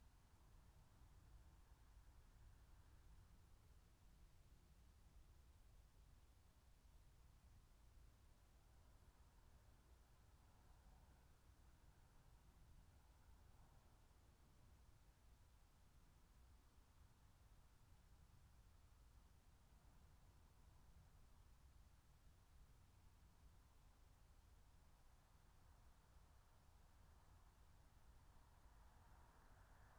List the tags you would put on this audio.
Soundscapes > Nature
meadow alice-holt-forest phenological-recording raspberry-pi nature field-recording soundscape natural-soundscape

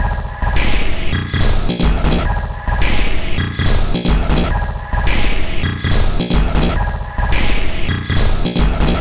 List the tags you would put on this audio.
Instrument samples > Percussion
Ambient Loop Samples Loopable Underground Industrial Alien Drum Packs Weird Soundtrack Dark

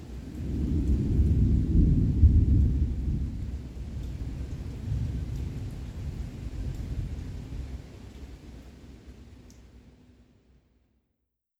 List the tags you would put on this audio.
Sound effects > Natural elements and explosions
Phone-recording roll thunder

